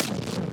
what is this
Sound effects > Experimental
destroyed glitchy impact fx -023
abstract, alien, clap, crack, edm, experimental, fx, glitch, glitchy, hiphop, idm, impact, impacts, laser, lazer, otherworldy, perc, percussion, pop, sfx, snap, whizz, zap